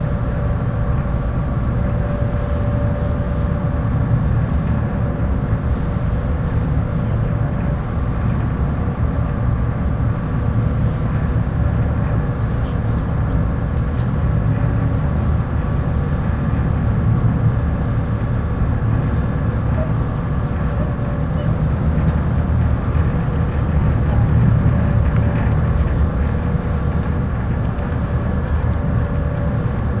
Soundscapes > Urban
AMBNaut-Summer Fort Morgan Ferry near Bow Afternoon with Passengers QCF Gulf Shores Alabama Zoom H1n
Bow of the Ferry between Dauphin Island and Fort Morgan, Alabama. Summer late afternoon, engines, passengers, seagulls, wind.
field-recording, seagulls, ferry, passengers, ship